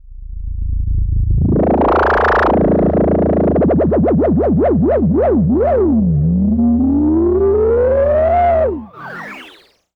Sound effects > Experimental
Analog Bass, Sweeps, and FX-061
alien, analog, analogue, bass, basses, bassy, complex, dark, effect, electro, electronic, fx, korg, machine, mechanical, oneshot, pad, retro, robot, robotic, sample, sci-fi, scifi, sfx, snythesizer, sweep, synth, trippy, vintage, weird